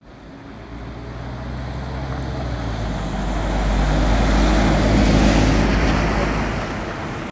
Soundscapes > Urban
Bus driving by recorded on an iPhone in an urban area.Bus driving by recorded on an iPhone in an urban area.